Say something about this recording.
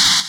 Instrument samples > Percussion
China 2 - 20 inches Zildjian Oriental Trash semilong
Avedis, bang, China, clang, clash, crack, crash, crunch, cymbal, Istanbul, low-pitchedmetal, Meinl, metallic, multi-China, multicrash, Paiste, polycrash, Sabian, shimmer, sinocrash, sinocymbal, smash, Soultone, spock, Stagg, Zildjian, Zultan